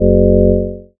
Instrument samples > Synths / Electronic
WHYBASS 1 Gb
additive-synthesis, bass, fm-synthesis